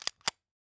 Sound effects > Other
Pistol Cock 2
The slide of a pistol being cocked quickly once. It sounds similar to Pistol Cock 1, but it's not the same. Recorded 1/1/26 with a Zoom H4Essential.
cock, gun, handgun, pistol